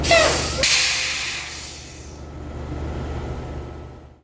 Sound effects > Vehicles
AIRBrst-Samsung Galaxy Smartphone, CU Truck, Air Brakes, Released Nicholas Judy TDC
Truck air brakes released.
Phone-recording, brakes, release, truck, air